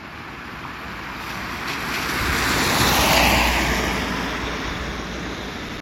Soundscapes > Urban
auto19 copy
The car driving by was recorded In Tampere, Hervanta. The sound file contains a sound of car driving by. I used an Iphone 14 to record this sound. It can be used for sound processing applications and projects for example.
car, traffic, vehicle